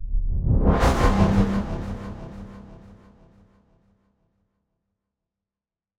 Sound effects > Electronic / Design
A synthetic whoosh designed in Phaseplant VST.
futuristic, cinematic, pass-by, transition, Whoosh